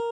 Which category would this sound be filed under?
Instrument samples > String